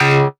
Instrument samples > Piano / Keyboard instruments
Bass-Future Bounce Bass 1 #C Key
Synthed with phaseplant only. Processed with Vocodex and ZL EQ. To use it better, try these below: 1. Drag it into your FLstudio samper. 2. Stretch mode just select ''Stretch'' 3. Pitch set to -50 cent. 4. Precomputer effect EQ set as ''-35%'' or ''-50%'' amount. 5. Play it in C4-G5 key range.
Bass, FutureBounce, Key, Lead, One-shot